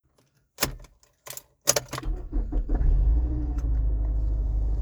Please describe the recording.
Sound effects > Vehicles
Key Ignition
Key turning twice and starting the engine; Keys rattling and turning, starter motor running, engine revving up. Recorded on the Samsung Galaxy Z Flip 3. Minor noise reduction has been applied in Audacity. The car used is a 2006 Mazda 6A.
vehicle, ignition, car, start, key-ignition, engine, car-interior